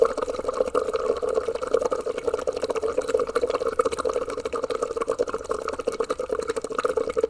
Sound effects > Natural elements and explosions
Thin and tinny water bubbles. Looped.